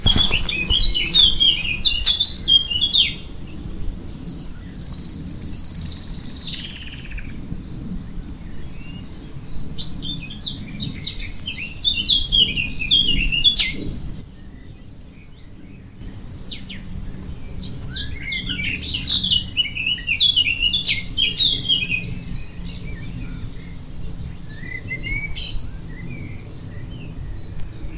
Soundscapes > Nature
Birds in Dutch Mountains
Birds whistling in south Netherlands with airplanes passing high over (as allways in NL)
birds, field-recording